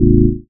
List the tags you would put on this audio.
Instrument samples > Synths / Electronic
fm-synthesis additive-synthesis bass

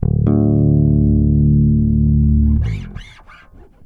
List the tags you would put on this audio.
Instrument samples > String
electric bass plucked rock slide mellow charvel oneshots riffs blues loop funk fx pluck loops

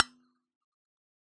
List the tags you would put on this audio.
Sound effects > Objects / House appliances
recording sampling percusive

